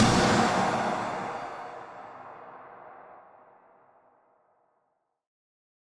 Instrument samples > Percussion
A main shimmercrash.
cymbal, clash, maincrash, Stagg, shimmer, crunch, splash, China, Zildjian, smash, drumbuilding, Soultone, spock, shivering, Paiste, timbre, clang, sinocrash, Zultan, shiver, Bosphorus, maincymbal, shake, crash, metallic, Sabian, sinocymbal, Meinl, metal